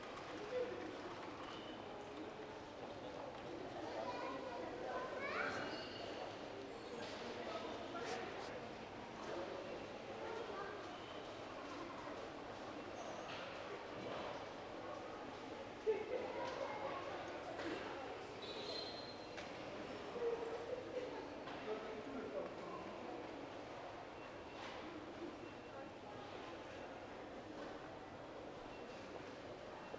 Soundscapes > Indoors
Shopping Mall
Old recording, made probably with phone, while shopping at the mall, most likely around February 2015 You can hear supermarket, the mall hallway and underground parkig lot sounds. Some highlights: #2:00 Supermarket PA, then some mic distortion #3:00 Waiting in line, then buying #5:20 Packing #6:00 Leaving supermarket, then mall hallway #6:40 Escalator ride to the underground parking lot
hall, shopping, mall